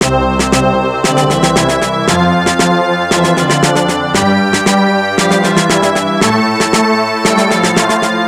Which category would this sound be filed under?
Music > Multiple instruments